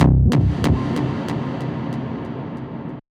Instrument samples > Synths / Electronic
CVLT BASS 34
sub, synthbass, bass, subs, drops, wobble, wavetable